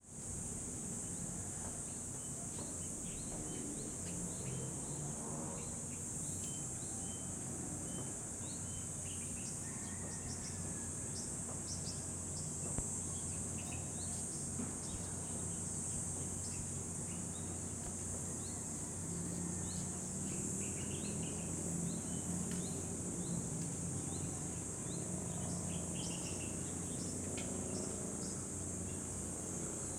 Soundscapes > Urban
250822 2937 PH Calm morning in a Filipino suburb
Calm morning in a Filipino suburb. I made this recording during a calm morning, from the terrace of a house located at Santa Monica Heights, which is a costal residential area near Calapan city (oriental Mindoro, Philippines). One can hear cicadas, roosters and dogs barking in the distance, birds chirping, some distant traffic, and few human activities in the surroundings. Recorded in August 2025 with an Olympus LS-P4 and a Rode Stereo videomic X (SVMX). Fade in/out applied in Audacity.
chirping, day, barking, traffic, morning, dogs, general-noise